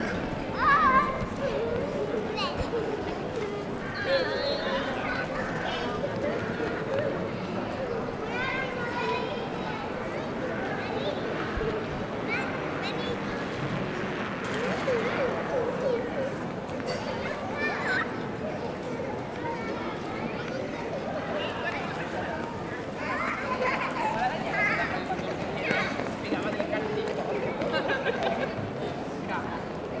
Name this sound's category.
Soundscapes > Urban